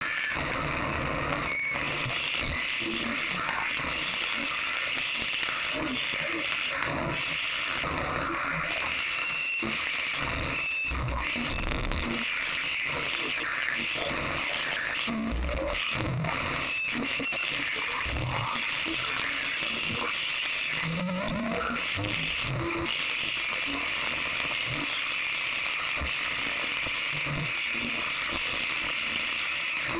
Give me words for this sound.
Sound effects > Other mechanisms, engines, machines
Static sound
Made back in June 2024. I apologise for not being active since 6+ months.